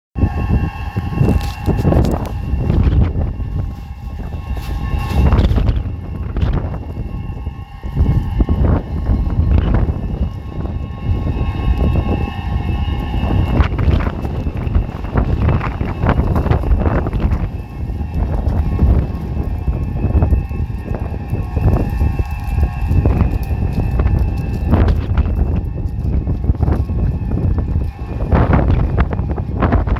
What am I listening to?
Soundscapes > Nature
A gale force wind is blowing through an empty warehouse in New Jersey at night, making an eerie high-pitched soundscape. I recorded on my Samsung phone so you will hear wind noise in the recording from the mic on the phone. Maybe you can cut around it, I will try eventually to do that.